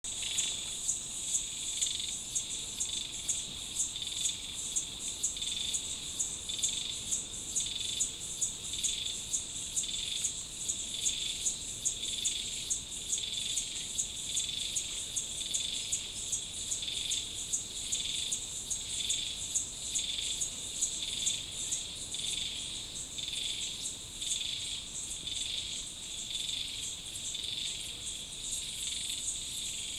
Soundscapes > Nature
Very loud insects (mostly cicadas but I think some other things are audible, including some flies buzzing by) in Tsitsikamma South Africa. Recorded with Clippy EM272s on 18 January 2025.